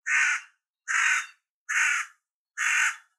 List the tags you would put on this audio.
Sound effects > Natural elements and explosions
bird
crow
filed
recording
sqwuak

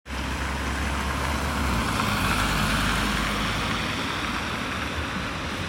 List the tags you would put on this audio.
Sound effects > Vehicles
rain
tampere
vehicle

